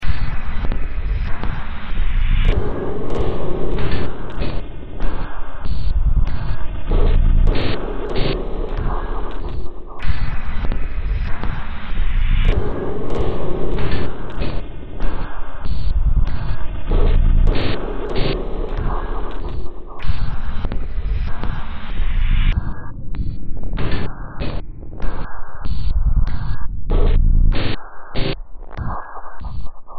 Music > Multiple instruments
Demo Track #3737 (Industraumatic)
Games, Sci-fi